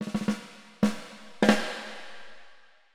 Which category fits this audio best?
Music > Solo percussion